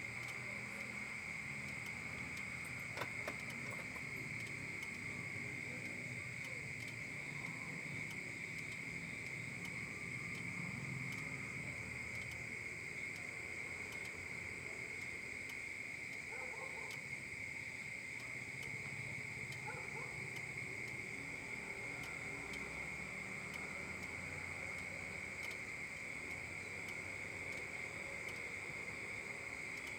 Soundscapes > Nature
Night Crickets in Alleyway – Nature Ambience
Night ambient recording from a narrow alley in Goa, India. Crickets create a steady, immersive natural soundscape. Calm, quiet, and perfect for nighttime scenes in rural or tropical settings.
ambience, field-recording